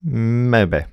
Speech > Solo speech
Doubt - Mmabee
dialogue, doubt, FR-AV2, Human, Male, Man, maybe, Mid-20s, Neumann, NPC, oneshot, singletake, Single-take, skeptic, skepticism, talk, Tascam, U67, Video-game, Vocal, voice, Voice-acting